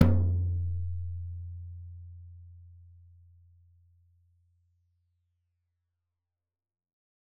Music > Solo percussion
Floor Tom Oneshot -027 - 16 by 16 inch
tom, toms, beats, tomdrum, rimshot, fill, perc, velocity, acoustic, flam, percussion, percs, beatloop, rim, drums, drumkit, floortom, kit, beat, drum, instrument, roll, oneshot, studio